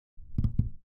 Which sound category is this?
Sound effects > Animals